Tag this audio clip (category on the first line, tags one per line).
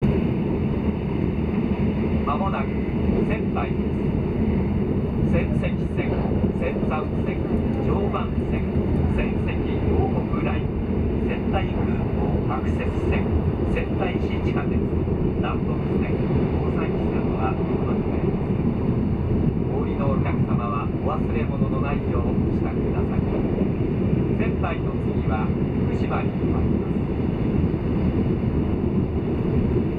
Soundscapes > Other
announcements
Hayabusa
japan
sendai
shinkansen
train